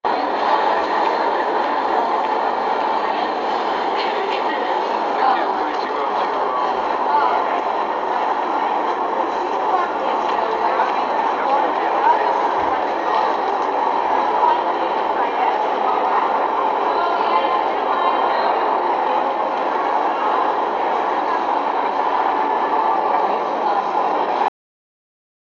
Sound effects > Human sounds and actions
walking around public in mall
walking around inside the mall around the general public.
crowds, mall, people, public